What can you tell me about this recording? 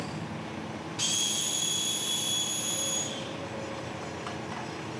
Sound effects > Vehicles
VEHCnst Street Construction Cement Mixer Truck Loud Whistle Usi Pro AB JackChildress RambleRecordings 002
This is the sound of a loud whistle from a cement mixer while it was pouring cement for a street repair. This was recorded in downtown Kansas City, Missouri in early September around 13:00h. This was recorded on a Sony PCM A-10. My mics are a pair of Uši Pros, mounted on a stereo bar in an AB configuration on a small tripod. The mics were placed in an open window facing the street where some road construction was happening. The audio was lightly processed in Logic Pro, The weather was in the low 20s celsius, dry, and clear.